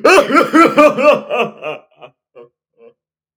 Speech > Solo speech
Laugh that I made. This was actually recorded in July of 2024.